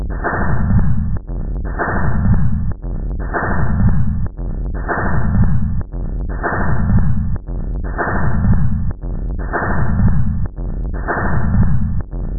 Instrument samples > Percussion
This 155bpm Drum Loop is good for composing Industrial/Electronic/Ambient songs or using as soundtrack to a sci-fi/suspense/horror indie game or short film.
Loopable, Packs, Industrial, Loop, Soundtrack, Alien, Weird, Underground, Drum, Dark, Ambient, Samples